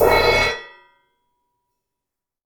Music > Solo instrument
Sabian 15 inch Custom Crash-9
15inch, Crash, Cymbal, Cymbals, Kit, Metal, Oneshot, Perc, Percussion, Sabian